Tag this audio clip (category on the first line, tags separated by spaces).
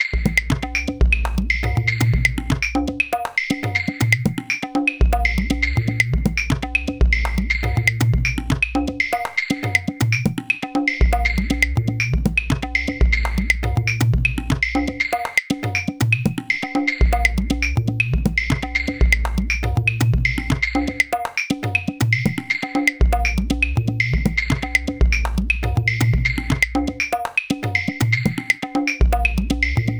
Music > Solo percussion
120bpm tabla loops tablas loop claves clave